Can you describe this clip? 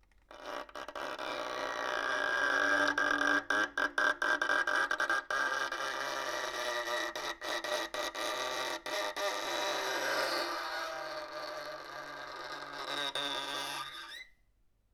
Instrument samples > String
Bowing broken violin string 8
Bowing the string(s) of a broken violn with a cello bow.
beatup, broken, creepy, uncomfortable, horror, violin, unsettling, bow, strings